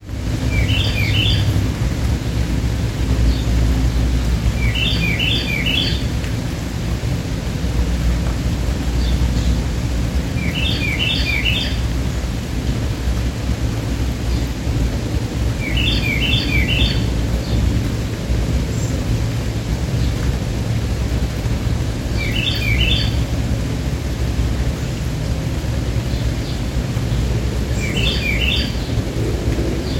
Soundscapes > Nature
BIRDSong-Samsung Galaxy Smartphone, CU Carolina Wren Calls, Other Birds, Rain Nicholas Judy TDC
A carolina wren calling with other birds and rain.